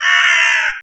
Sound effects > Animals
Crow Call
The sound a crow makes.
birb, bird, bird-sound, black-bird, call, caw, cawing, crow, crow-call, crow-caw, crow-sound, distant, far, far-away, flight, gray-bird, grey-bird, loud